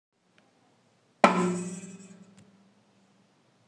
Instrument samples > Percussion
Drum hit 1
A drum hit i recorded.
Drum, Hit, Sample